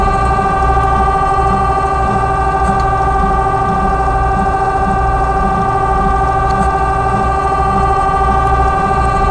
Sound effects > Other
TOYMech-Blue Snowball Microphone, CU Top, Humming Note Nicholas Judy TDC
A humming top note.